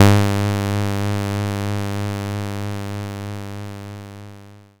Instrument samples > Synths / Electronic
Synthed with odin2 only. To play it better, pitch wheel down 700 cent, and open your FL studio sampler ENV settings. Hold: 0 Decay: 0 Sus: 0 Release: 1.7 Finally, play it in C4-C6 key range.